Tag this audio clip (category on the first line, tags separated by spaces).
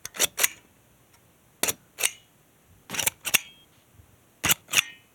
Sound effects > Objects / House appliances
click mechanism press release stamp